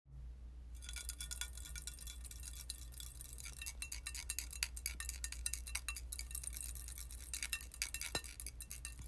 Sound effects > Objects / House appliances
Soda can pop tab (mimicked using metal mint container box)
Made this sound using a mint container metal box with candy crumbs inside and shaking it. I was trying to mimic the sound of a soda pop tab stuck inside the can. Recorded with iphone 16 pro max and in quiet room.
box, can, cap, pop, poptab